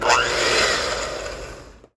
Sound effects > Objects / House appliances
MACHAppl-Samsung Galaxy Smartphone, CU Electric Mixer, Turn On, Run at Low Speed, Off, Short 01 Nicholas Judy TDC
An electric mixer turning on, running at low speed and turning off. Short.
electric-mixer, Phone-recording, turn-on, short, low-speed, turn-off